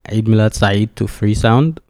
Speech > Solo speech
My Egyptian housemate saying _ In Arabic.